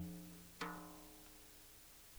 Music > Solo percussion
hi tom-oneshot fx with hiss10 inch by 8 inch Sonor Force 3007 Maple Rack
acoustic, beat, beatloop, beats, drum, drumkit, drums, fill, flam, hi-tom, hitom, instrument, kit, oneshot, perc, percs, percussion, rim, rimshot, roll, studio, tom, tomdrum, toms, velocity